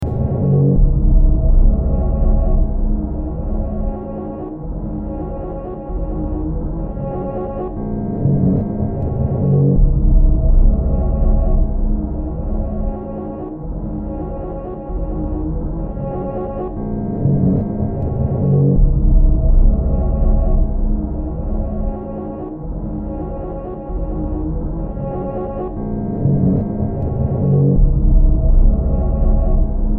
Soundscapes > Synthetic / Artificial
Looppelganger #161 | Dark Ambient Sound
Silent, Gothic, Survival, Games, Drone, Soundtrack, Sci-fi, Noise, Ambient, Underground, Darkness, Weird, Hill, Horror, Ambience